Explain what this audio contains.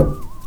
Sound effects > Other mechanisms, engines, machines
Handsaw Pitched Tone Twang Metal Foley 1
shop, vibe, metal, sfx, saw, metallic, plank, smack, handsaw, perc, household, tool, vibration, foley, hit, fx, percussion, twang, twangy